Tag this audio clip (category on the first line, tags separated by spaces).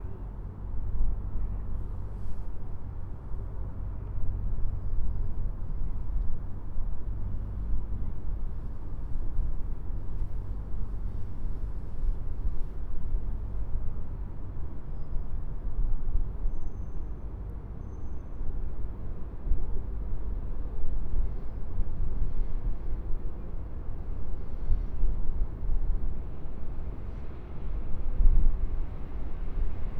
Soundscapes > Urban
antwerp boat footsteps harbour recording schelde ship windy